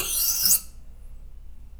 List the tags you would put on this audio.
Sound effects > Objects / House appliances
Beam Clang ding Foley FX Klang Metal metallic Perc SFX ting Trippy Vibrate Vibration Wobble

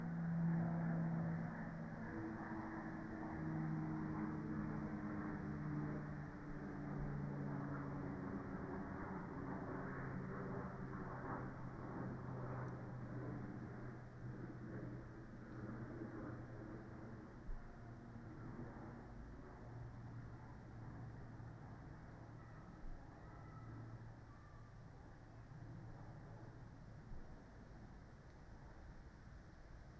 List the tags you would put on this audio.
Soundscapes > Nature
alice-holt-forest artistic-intervention soundscape nature weather-data modified-soundscape Dendrophone natural-soundscape sound-installation raspberry-pi field-recording phenological-recording data-to-sound

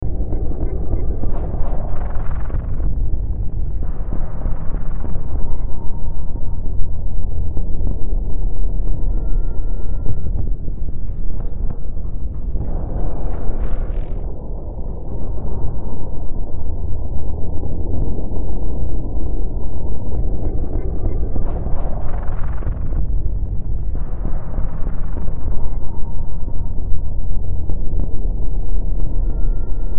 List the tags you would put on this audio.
Soundscapes > Synthetic / Artificial
Ambient Gothic Noise Sci-fi Soundtrack Underground

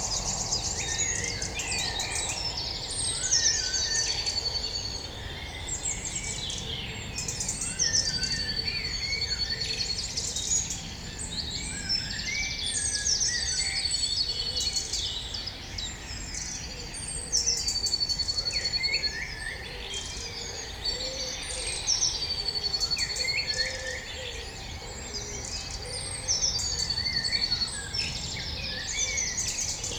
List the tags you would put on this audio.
Soundscapes > Nature
birdsong birds nature spring dawn-chorus field-recording forest

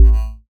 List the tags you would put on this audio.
Instrument samples > Synths / Electronic
bass additive-synthesis fm-synthesis

